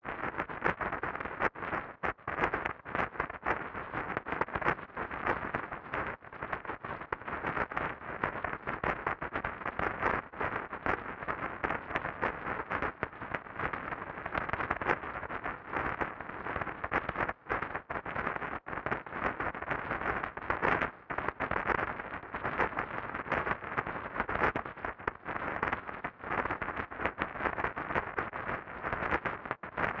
Sound effects > Objects / House appliances
Error TV Noise 2
Error, Noise, Radio, TV